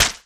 Sound effects > Electronic / Design
Matchstrike Fail-04
Matchstick being struck against a matchbox or rough surface unsuccessfully. Variation 4 of 4.
scrape, matchstick, fail, attempt, fire, strike, scratch, light